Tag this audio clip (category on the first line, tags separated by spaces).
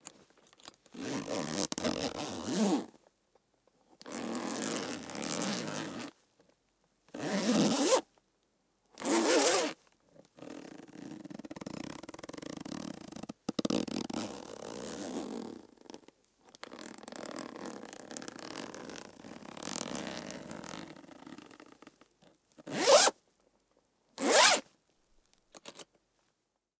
Sound effects > Objects / House appliances
bag clasp closure crack fastening fly suitcase whack zip zipper